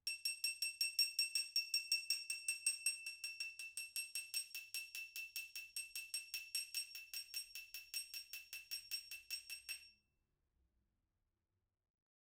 Sound effects > Other
Glass applause 18
applause, cling, clinging, FR-AV2, glass, individual, NT5, person, Rode, single, solo-crowd, wine-glass, XY